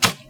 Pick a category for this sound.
Sound effects > Other